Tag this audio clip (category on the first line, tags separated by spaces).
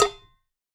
Sound effects > Objects / House appliances
single-hit percussion percussive